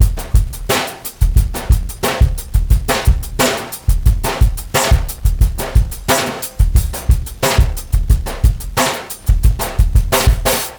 Music > Solo percussion
bb drum break loop stew 89
A short set of Acoustic Breakbeats recorded and processed on tape. All at 89BPM
89BPM, Acoustic, Break, Breakbeat, Drum, DrumLoop, Drum-Set, Vintage, Vinyl